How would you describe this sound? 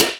Instrument samples > Percussion
hi-hatized crash 20'' Sabian Vault Artisan
Bosporus, brass, bronze, chick-cymbals, click, closed-cymbals, closed-hat, crisp, cymbal-pedal, dark, dark-crisp, drum, drums, facing-cymbals, hat, hat-cymbal, hat-set, hi-hat, Istanbul, Meinl, metal, metallic, minicymbal, Paiste, percussion, picocymbal, Sabian, snappy-hats, tick, Zildjian